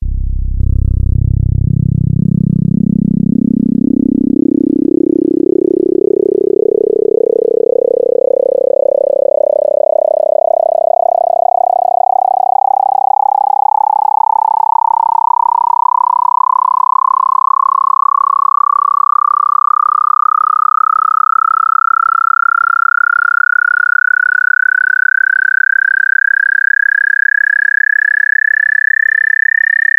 Instrument samples > Synths / Electronic
06. FM-X RES1 SKIRT5 RES0-99 bpm110change C0root
Yamaha, MODX, FM-X